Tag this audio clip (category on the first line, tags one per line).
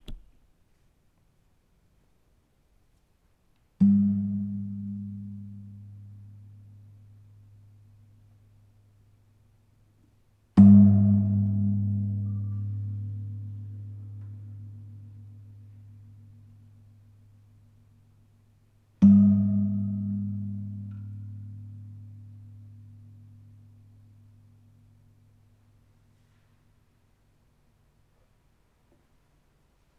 Instrument samples > Percussion
percussion
temple